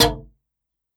Instrument samples > Other
rsess string bass18 a
Bass in A made by piece of string
Nylon, String, Bass